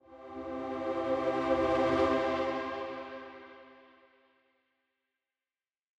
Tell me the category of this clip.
Sound effects > Electronic / Design